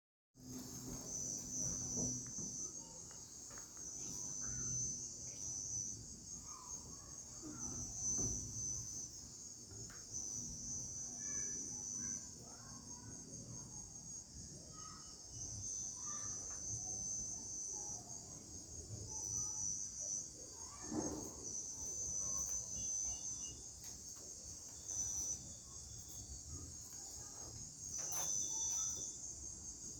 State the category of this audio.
Soundscapes > Nature